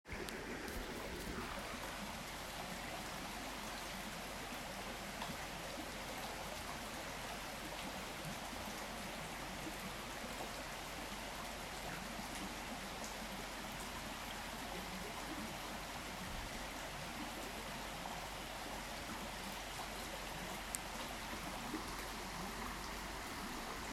Soundscapes > Nature
Sound of the river flowing and waterfall at the beck in Keighley, West Yorkshire